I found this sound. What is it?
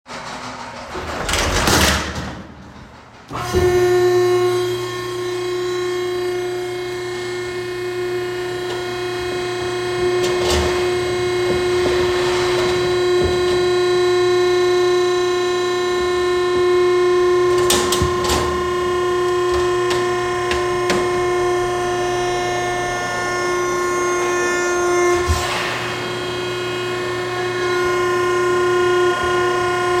Soundscapes > Indoors
recorded the baler with my iPhone again at work. Who knows, maybe I'll do a 4th. But only if it was super full and could give a more interesting sound than these 3.
box, cardboard, crushing